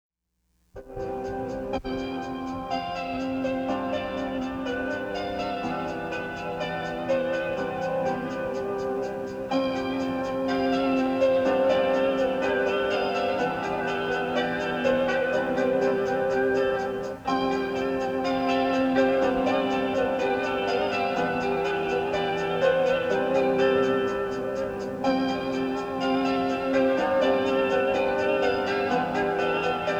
Music > Multiple instruments
Frutiger Aero On Hold Music / Commercial Break Loop (tape recording)
A short, looping piece of music that sounds like the on-hold phone music or something used during a commercial break. Recorded from a tape cassette recorder, which used a room mic to capture the synth plus guitar played live.
cassette, commercial, commercial-break, demo, frutiger, frutiger-aero, liminal, loop, music, retro, ringtone, synth, tape